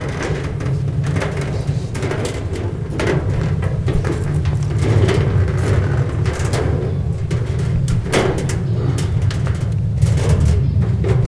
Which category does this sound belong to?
Soundscapes > Indoors